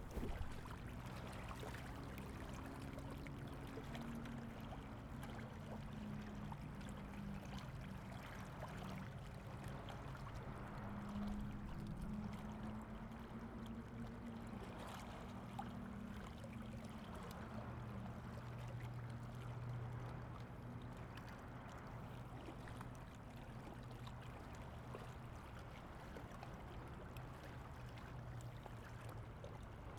Soundscapes > Nature
waterfront rocky seashore waves close
Calm sea waves crashing on big rocks. Distant big sandy beach waves can be heard on the left. Deep humming from a big ship of some kind far away can be heard on the right. Recorded on Zoom H2e, with 120° pick-up pattern.
close, rocks, sea, seaside, shore, waves